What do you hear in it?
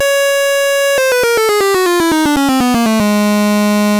Sound effects > Other
gameOver HighPitch
High Pitch Game Over sound
computer, C64, game